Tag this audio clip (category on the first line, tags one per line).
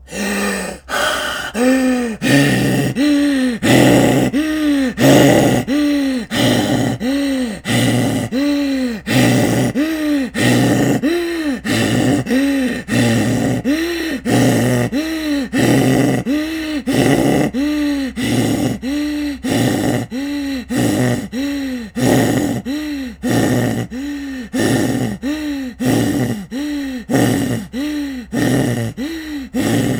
Sound effects > Human sounds and actions
Blue-Snowball
breath
gasp